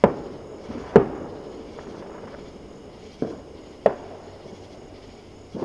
Sound effects > Other
Fireworks samples recorded during a heat wave in the southeast United States, July 4, 2025. Like a weed, the American Dream is constantly growing, under attack and evolving. Some people hate it and want to destroy it, some people tolerate living within it and some people glorify it.
america, day, electronic, experimental, explosions, fireworks, fireworks-samples, free-samples, independence, patriotic, sample-packs, samples, sfx, United-States